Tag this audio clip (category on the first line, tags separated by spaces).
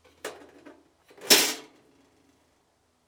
Soundscapes > Urban
open yukon